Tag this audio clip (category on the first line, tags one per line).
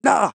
Speech > Solo speech
Acapella
Volcal
Oneshot
EDM
BrazilFunk